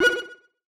Sound effects > Electronic / Design
HARSH INNOVATIVE OBSCURE CLICK
BEEP, HARSH, EXPERIMENTAL, SHARP, HIT, UNIQUE, BOOP, CHIPPY, OBSCURE, COMPUTER, ELECTRONIC, SYNTHETIC, DING, CIRCUIT, INNOVATIVE